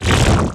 Sound effects > Electronic / Design
Cake or shit on your face-5!
Hi ! If you used it in your games ,movies , Videos , you should tell me , because it's really cool!!! I just used a wavetable of Crystal and used ENV to give its WT Position some move. And Used a sine wave to modulate it Distortion is the final process Enjoy your sound designing day!
Movie, FX, cake, OneShot, Rumble, Synthtic, Game, shit, Cartoon